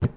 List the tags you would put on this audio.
Sound effects > Objects / House appliances
carry container handle knock liquid shake slam tool

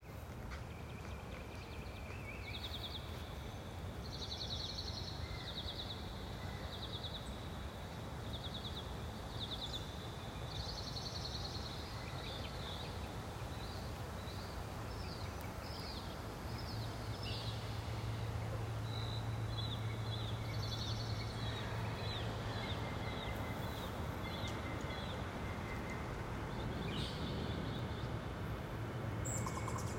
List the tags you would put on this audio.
Soundscapes > Nature
airplanes ambient birds field-recording nature soundscape soundscapes traffic

